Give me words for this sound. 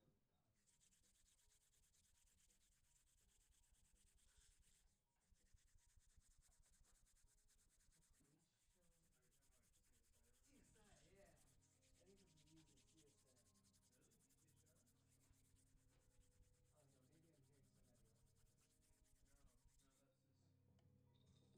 Sound effects > Human sounds and actions
Brushing Teeth 03
I rub my hands fast together